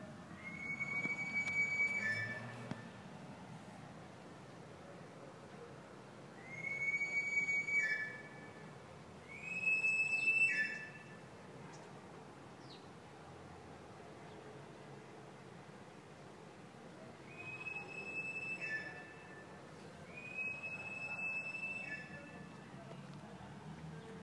Soundscapes > Urban
flute of Knive Sharpner
The sound of a knife sharpener announcing its service in Mexico City.
Flute, pansflute, street